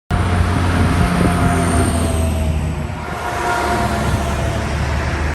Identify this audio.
Sound effects > Vehicles
Sun Dec 21 2025 (20)
Truck passing by in highway
truck, road, highway